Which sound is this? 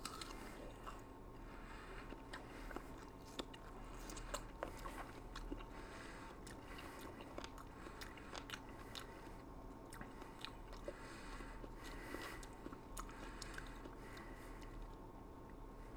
Sound effects > Human sounds and actions
FOODEat-MCU Cantaloupe Nicholas Judy TDC
Eating a cantaloupe.
cantaloupe, eat, foley, human, Phone-recording